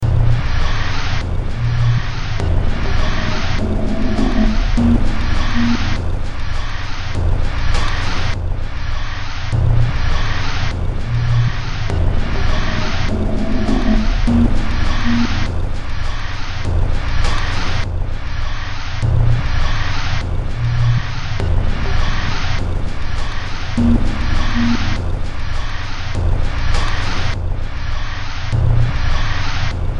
Music > Multiple instruments
Demo Track #3745 (Industraumatic)
Soundtrack, Sci-fi, Noise, Ambient, Cyberpunk, Industrial, Games, Underground, Horror